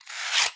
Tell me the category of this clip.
Sound effects > Objects / House appliances